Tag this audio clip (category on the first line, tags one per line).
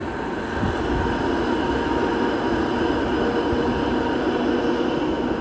Sound effects > Vehicles
tram
Tampere